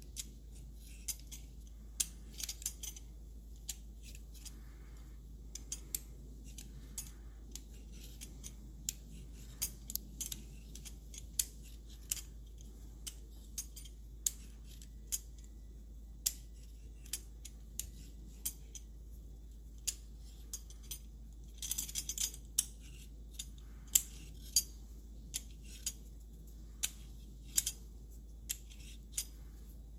Sound effects > Objects / House appliances
FOODCook-Samsung Galaxy Smartphone, MCU Potato Peeler, Peel Potato Nicholas Judy TDC
A potato peeler peeling a potato.